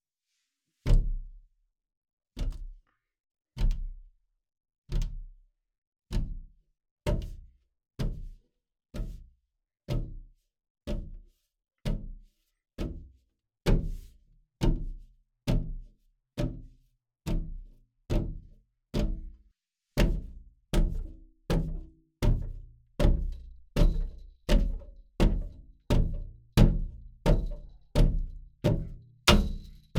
Sound effects > Other
Banging against window (Dry)
Sounds of banging against a window. We're seeking contributors!